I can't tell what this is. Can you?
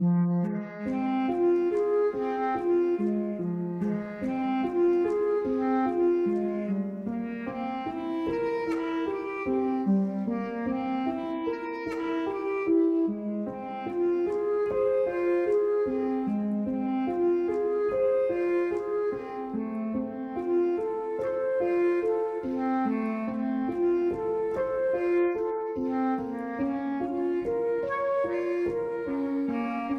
Music > Solo instrument
Classical slow violin
a very slow and repetitive violin
cinematic, classical, violin